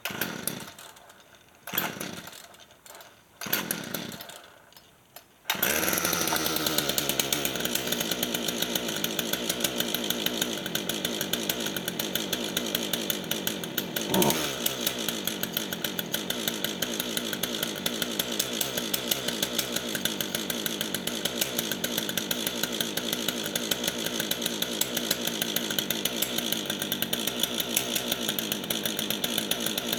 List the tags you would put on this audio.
Sound effects > Other mechanisms, engines, machines
chainsaw
cold-start
coldstart
engine
equipment
idle
idling
machine
motor
rev
revving
saw
sputter
start
starting
startup
two-stroke
twostroke

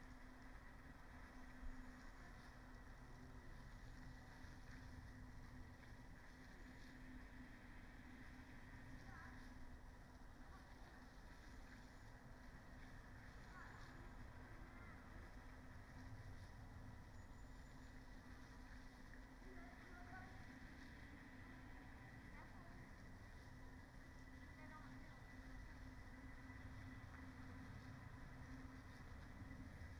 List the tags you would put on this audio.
Soundscapes > Nature
soundscape
nature
weather-data
modified-soundscape
sound-installation
natural-soundscape
raspberry-pi
artistic-intervention
field-recording
alice-holt-forest
phenological-recording
data-to-sound
Dendrophone

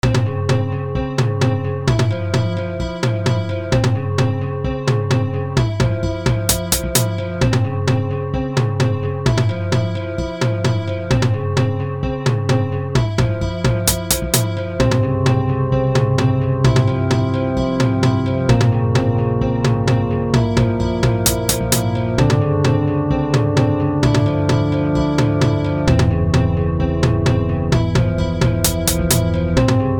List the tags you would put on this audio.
Music > Multiple instruments
soundtrack
happy
dark
music
cinematic
film
score
intro
movie

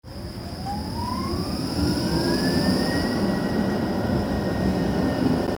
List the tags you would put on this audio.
Soundscapes > Urban
streetcar
tram
transport

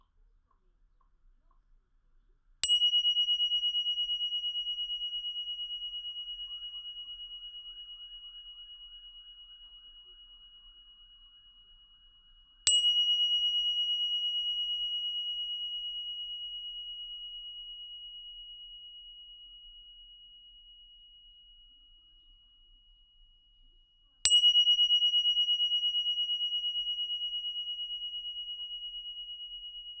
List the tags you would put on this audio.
Instrument samples > Percussion
meditation
campana
carillon
chime
sonido
bell
bells
campanita

